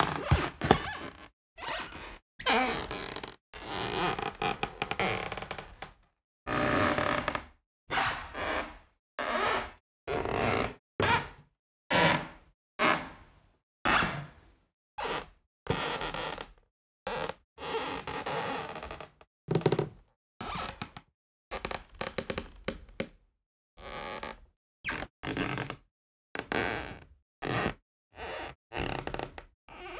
Sound effects > Human sounds and actions
Creaky Floorboards
A recording of squeaky and creaking floorboards. A lengthier recording was edited down into useful fragments and in this sound file they have been placed end-to-end with a short silence between each, facilitating easy editing down to just the bits that are most suitable to you. Recorded using a pair of Sennheiser MKH8040s in XY configuration.
footsteps, creak, floorboards, creaking, floor, squeak